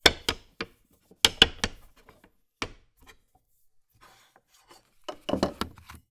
Music > Solo instrument
Sifting Through Loose Marimba Keys Notes Blocks 12
tink, foley, oneshotes, thud, keys, block, rustle, wood, fx, loose, marimba, woodblock, notes, perc, percussion